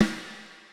Music > Solo percussion
Snare Processed - Oneshot 98 - 14 by 6.5 inch Brass Ludwig
drums, processed, flam, snare, beat, rimshots, hits, fx, acoustic, realdrum, ludwig, crack, sfx, roll, snareroll, snaredrum, kit, oneshot, realdrums, brass, percussion, reverb, perc, rimshot, drum, hit, drumkit, snares, rim